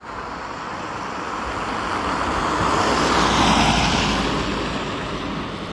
Sound effects > Vehicles
Car driving 3
Car sound recorded outdoors in Hervanta, Tampere using an iPhone 14 Pro. Recorded near a city street on a wet surface for a university vehicle sound classification project. No speech or audio processing.
car, drive, engine, hervanta, outdoor, road, tampere